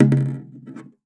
Music > Solo instrument
Toms Misc Perc Hits and Rhythms-003
Crash, GONG, Percussion, Perc, Ride, Sabian, Drums, Cymbals, FX, Paiste, Custom, Hat, Cymbal